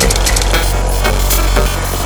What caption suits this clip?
Sound effects > Electronic / Design
Industrial Estate 28
120bpm, Ableton, chaos, industrial, loops, soundtrack, techno